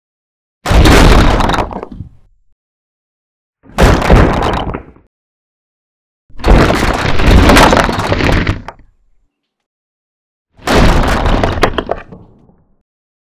Sound effects > Natural elements and explosions
homemade rock impact explosive sounds 01032026
avalanche, avatar, boulder, caving, concrete, crash, crashing, debris, destroy, destruction, earth, earthquake, elemental, explosive, fall, falling, gravel, hit, impact, rock, rubble, stone